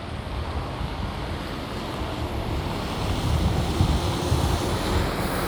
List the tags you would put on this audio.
Sound effects > Vehicles
vehicle
bus

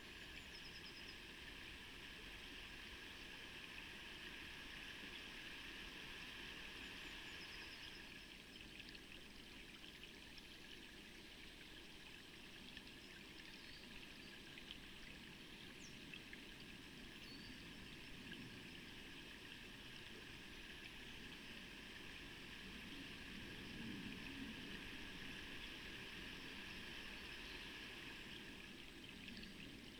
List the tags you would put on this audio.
Soundscapes > Nature
phenological-recording
sound-installation
field-recording
weather-data
natural-soundscape
Dendrophone
raspberry-pi
modified-soundscape
artistic-intervention

